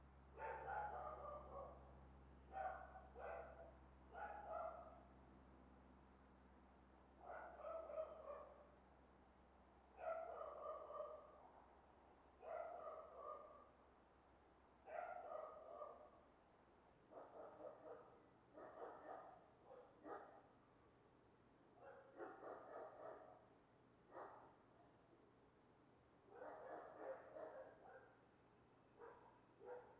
Soundscapes > Urban
Backyard dogs barking
Dogs barking in backyards on both sides of a home in the Riverdale neighbourhood of Yukon at around 10 p.m. on an August night. Recorded on a Zoom H2n in 150-degree stereo mode.
field-recording, barking, riverdale, pets, dogs, backyard, yukon